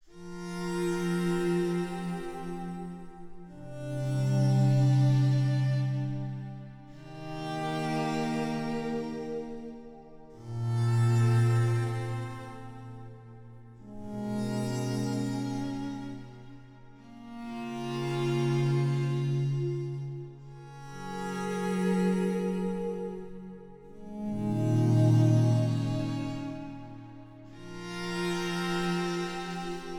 Music > Multiple instruments
Contemplative String Loop (Violin and Cello)
A melancholy and introspective string loop of cello and violin, created in FL Studio using Kontakt, Raum, and Ripple Phaser